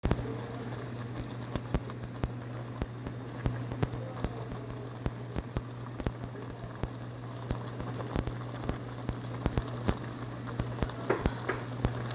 Sound effects > Other
Boiling water and chopping sounds in the kitchen
This recording captures the ambient sound of boiling water and knife chopping in a home kitchen. You can hear the bubbling of the water in the pot, along with rhythmic chopping on a cutting board. The atmosphere is natural and domestic, making it useful for cooking scenes, kitchen ambience, or realistic household Foley.